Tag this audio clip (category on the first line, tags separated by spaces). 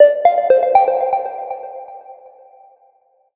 Sound effects > Electronic / Design
kart StartingLine Race